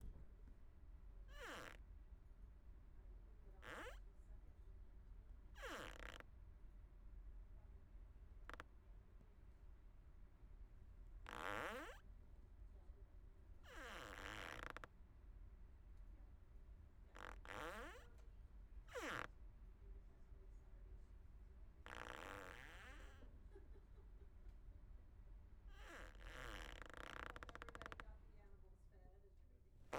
Sound effects > Other
Plastic Squeak Rubbing
This was made using the zoom H6 recorder. I have a leather office chair at work, as well as a large plastic speaker that sits by my desk. When I accidentally turned my chair and the edge rubbed against the plastic, it created a pleasant squeaking, rubbing sound. This is that sound.